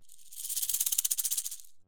Instrument samples > Percussion
Dual shaker-018
sampling, percusive, recording